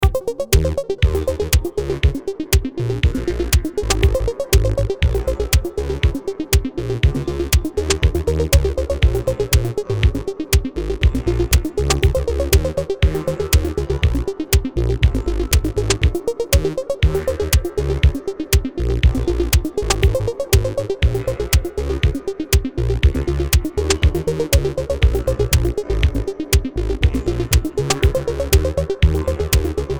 Music > Multiple instruments
Oldschool Funk 120BPM Idea with Arp and Synths made into a Loop
Made in FL11, not sure, old idea i made while trying stuff out like the rest of these.